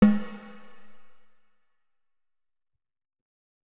Music > Solo percussion
Snare Processed - Oneshot 191 - 14 by 6.5 inch Brass Ludwig
crack, rimshots, rimshot, snaredrum, hits, drum, hit, drumkit, beat, brass, rim, processed, sfx, ludwig, perc, realdrum, roll, realdrums, kit, snareroll, fx, acoustic, snares, percussion, reverb, oneshot, drums, snare, flam